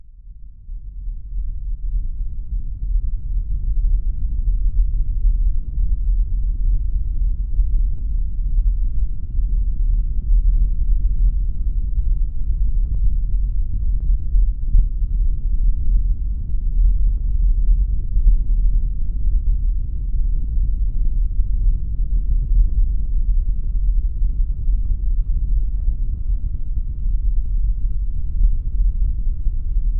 Soundscapes > Synthetic / Artificial
Deep ambient rumble. Recorded from a fan, it was pitched down to highlight the rumble in the recording.
ambience, ambient, bass, deep, low, rumble, wind